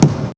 Instrument samples > Percussion
Tap Kick 2
drum, drumkick, drums, kick, kickdrum, lofi, tap, vintage